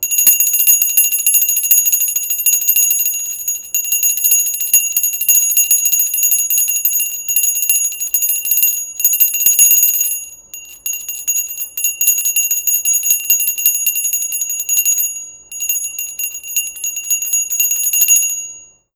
Sound effects > Objects / House appliances
BELLHand-Blue Snowball Microphone, CU Altar, Ringing Nicholas Judy TDC
An altar bell ringing.
lutheranism, saints-bell, sacryn, sance-bell, sanctus, ring, Blue-brand, roman-rite, altar, anglican, catholic-church, anglicanism, lutheran, Blue-Snowball, roman, bell, mass, sacring